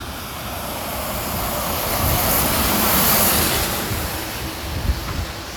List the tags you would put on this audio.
Soundscapes > Urban
Bus Traffic Street Public

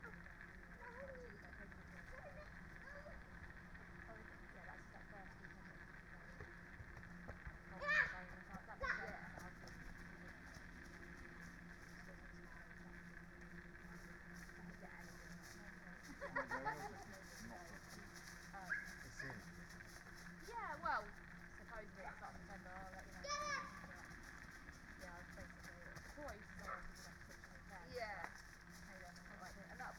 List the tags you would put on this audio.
Soundscapes > Nature
Dendrophone phenological-recording raspberry-pi weather-data data-to-sound nature artistic-intervention soundscape natural-soundscape modified-soundscape field-recording sound-installation alice-holt-forest

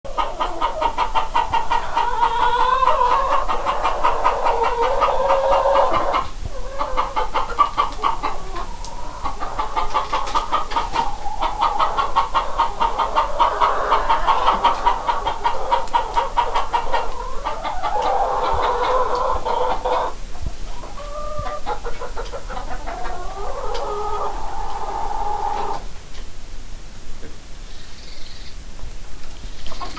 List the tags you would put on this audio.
Sound effects > Animals
birds clucking nature farm animals country chickens

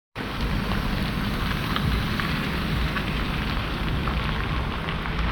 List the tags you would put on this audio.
Sound effects > Vehicles
Car Tampere field-recording

